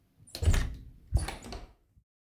Sound effects > Objects / House appliances
doors oppening
Oppening doors and closing. Whatever u choose its up to you.